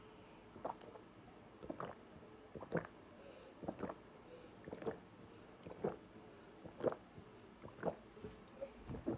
Sound effects > Human sounds and actions
Gulping a Glass of Water
Stereo recording close of a man chugging a full glass of water.